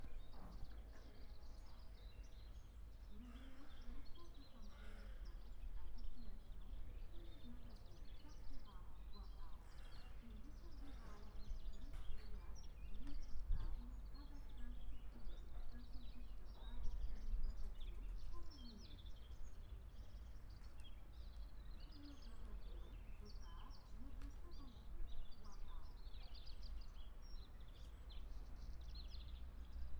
Soundscapes > Indoors
250725 09h50 Gare Albi Madeleine - OKM1
Subject : A train stopping by the Albi Madeleine train station. Date YMD : 2025 July 25 09h50 Location : Albi 81000 Tarn Occitanie France. Soundman OKM-1 in ear binaural microphones. Weather : Light grey sky (with small pockets of light). A few breezes About 16°c Processing : Trimmed and normalised in Audacity.
Albi, Soundman, passing-by, France, Morning, FR-AV2, City, in-ear-microphones, Tarn, OKM1, train-station, in-ear, Friday, SNCF, 2025, train, field-recording, Tascam, OKM-I, grey-sky, Occitanie, 81000, Binaural, July